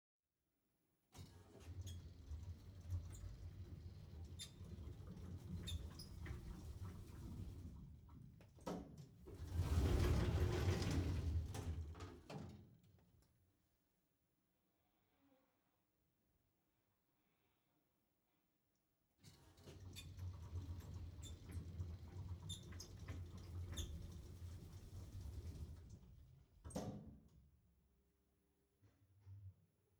Sound effects > Other mechanisms, engines, machines
MACHElev Opening-Closing-Close Up GILLE DüSSELDORF Zoom H3VR 2025-11-27 BFormat 1.1 16
Ambisonics Field Recording converted to B-Format. Information about Microphone and Recording Location in the title.
3D, ambisonics, binaural, elevator, spatial